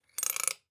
Sound effects > Other mechanisms, engines, machines
Metal Scrape 03
metal, noise